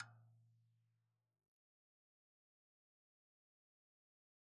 Music > Solo percussion
Med-low Tom - Oneshot 38 12 inch Sonor Force 3007 Maple Rack
drum, drumkit, drums, loop, med-tom, percussion, toms, wood